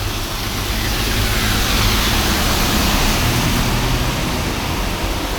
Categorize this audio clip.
Sound effects > Vehicles